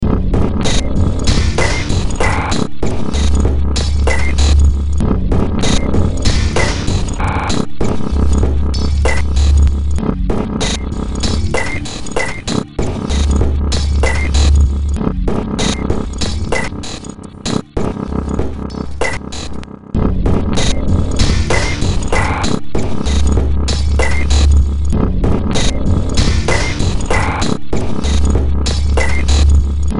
Music > Multiple instruments
Demo Track #3656 (Industraumatic)
Ambient, Cyberpunk, Games, Horror, Industrial, Noise, Sci-fi, Soundtrack, Underground